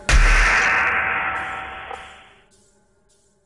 Sound effects > Electronic / Design
Impact Percs with Bass and fx-033
deep, impact, crunch, perc, explosion, theatrical, cinamatic, bass, ominous, fx, combination, sfx, bash, low, looming, oneshot, hit, smash, explode, brooding, percussion, mulit, foreboding